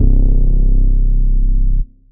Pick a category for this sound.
Instrument samples > Synths / Electronic